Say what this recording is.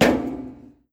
Sound effects > Objects / House appliances
An air conditioner hit.